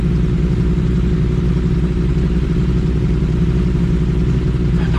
Sound effects > Other mechanisms, engines, machines
clip prätkä (13)
Supersport, Motorcycle, Ducati